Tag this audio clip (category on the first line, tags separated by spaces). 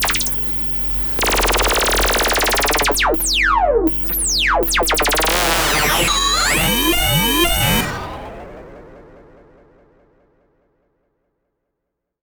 Sound effects > Experimental
alien analog analogue bass basses bassy complex dark effect electro electronic fx korg machine mechanical oneshot pad retro robot robotic sample sci-fi scifi sfx snythesizer sweep synth trippy vintage weird